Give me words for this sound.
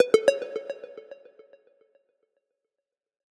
Sound effects > Electronic / Design
Mobile phone notification sound made in Fl Studio (FLEX) Free!